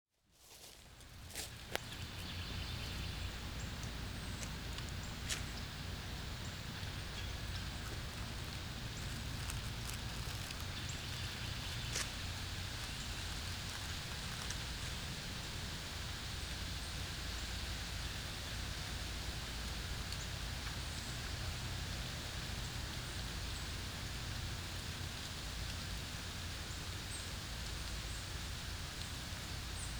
Soundscapes > Nature
Autumn woods soundscape Mix Two November 7 2025
While the sights of autumn here in Illinois are becoming very vivid, with the oranges, and yellows, golds and reds filling the woods, the sounds of autumn - on the other hand - are way more subtle. Delicate. This is a recording featuring the soft, steady sifting sound of wind blowing through the trees. A few notes: #1:18 the soft sound of a dry leaf falling to the forest floor #2:08---2:18 a collection of several colorful leaves gently falling to their final resting place on the soft forest floor #2:20---2:26 More leaves falling to the colorful forest floor #2:26 A very heavy twig falls to the forest floor.